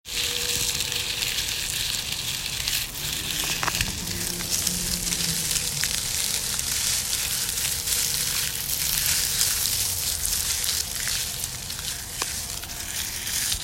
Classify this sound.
Sound effects > Natural elements and explosions